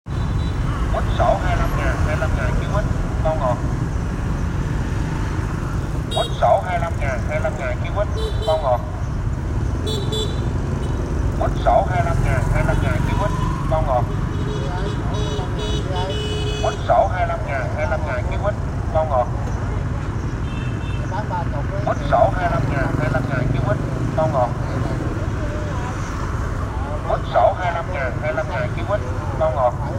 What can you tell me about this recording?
Speech > Solo speech
Huýt Sao 25 Ngàn, 25 Ngàn Ký Huýt Bao Ngọt
Man sell orange fruit say 'Huýt sao 25 ngàn, 25 ngàn ký huýt, bao ngọt'. Record use iPhone 7 Plus smart phone 2025.12.23 16:56.
business, fruit, male, man, orange, sell, viet, voice